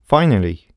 Speech > Solo speech
Relief - finaly
FR-AV2, voice, Vocal, oneshot, NPC, Voice-acting, Tascam, Neumann, relief, dialogue, U67, Video-game, Human, Man, talk, Single-take, Male, Mid-20s, singletake